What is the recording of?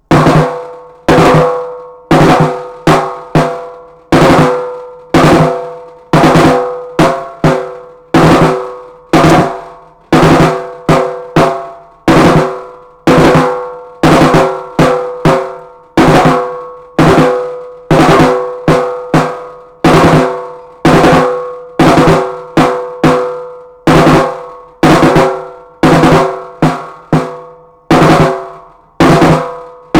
Music > Solo percussion
MUSCPerc-Blue Snowball Microphone, CU Drum, Snare, Military Marching Band Nicholas Judy TDC
A military marching band snare.
Blue-brand, Blue-Snowball, drum, marching-band, military, snare, snare-drum